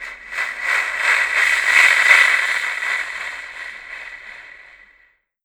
Sound effects > Vehicles
TOONVeh-Blue Snowball Microphone, CU Steam Train, Pass By Nicholas Judy TDC
A cartoon steam train passing by. Simulated using an Acme Windmaster.
steam, pass-by, Blue-Snowball